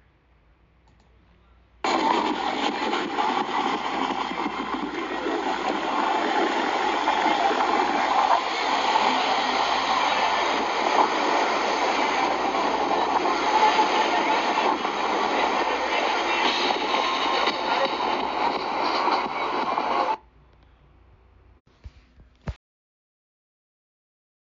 Sound effects > Human sounds and actions
walking on the beach.